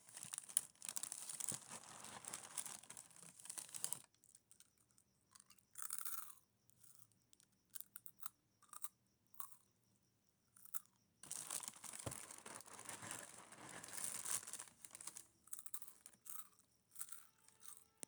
Sound effects > Human sounds and actions
Eating a cereal thats crunchy and the hand movement on the bag